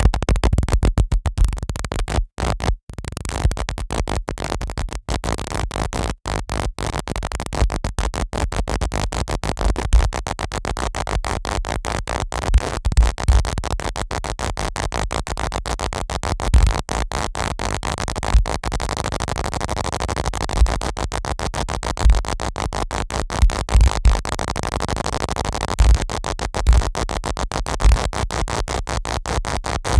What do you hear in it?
Soundscapes > Synthetic / Artificial
Electronic Circuits Capacitor and Inductors Cracking and Glitching #001
Sounds coming from electronic circuits components. They remind a picture of electronic components unraveling or falling apart. Can be used to extract part of them and build new distorted, glitchy textures and landscapes AI Software: Suno Prompt: Texture, atonal, glitches, electronics, electromagnetic field, electronics component, noise
AI-generated, atonal, capacitor, electronic, electronica, electronics, experimental, glitch, inductor, noise, pcb